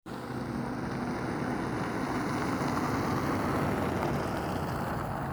Soundscapes > Urban
voice 18-11-2025 2 car
Car, CarInTampere, vehicle